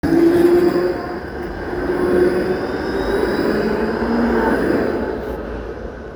Sound effects > Vehicles
tram3 accelerate
A tram accelerated on an almost-winter day. The sound was recorded in Hervanta, Tampere, using the built-in microphone of the Samsung Galaxy S21 FE. No special grea was used besides that; the recorder just simply tried his best to prevent noise. The sound was recorded to be used as a sample for a binary audio classification project.
tram, tram-accelerate, traffic